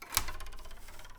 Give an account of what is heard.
Sound effects > Other mechanisms, engines, machines
metal shop foley -122
crackle, oneshot, little, bam, wood, pop, tools, rustle, metal, strike, sound, tink, sfx, perc, percussion, boom, shop, bop, knock, thud, foley, bang, fx